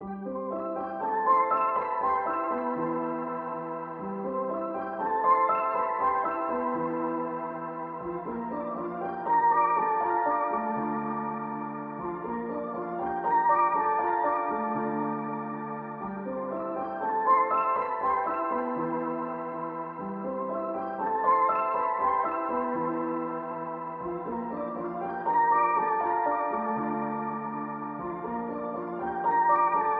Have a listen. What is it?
Music > Solo instrument
Piano loops 195 efect 2 octave long loop 120 bpm
music, reverb, 120, simple, piano, pianomusic, free, simplesamples, 120bpm, loop, samples